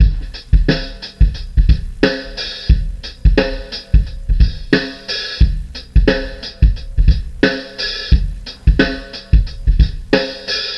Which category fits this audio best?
Music > Solo percussion